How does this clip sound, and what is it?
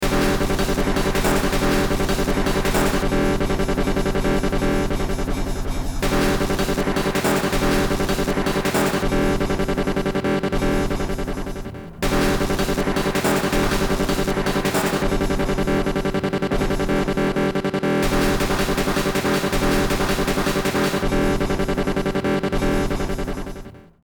Music > Multiple instruments
Short Track #3455 (Industraumatic)
Ambient, Cyberpunk, Games, Horror, Industrial, Noise, Sci-fi, Soundtrack, Underground